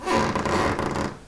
Sound effects > Human sounds and actions
Creaking Floorboards 16
hardwood, screech, bare-foot, room, walking, grind, going, wood, floorboards, heavy, squeak, floorboard, creaking, footstep, squeaky, flooring, wooden, scrape, squeal, creaky, rub